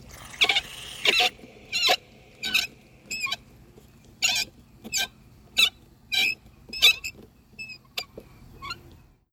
Sound effects > Other mechanisms, engines, machines
METLFric-Samsung Galaxy Smartphone, CU Valve Squeaks Nicholas Judy TDC
A valve squeaking.